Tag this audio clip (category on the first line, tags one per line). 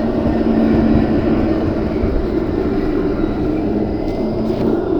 Sound effects > Vehicles
tramway
transportation
vehicle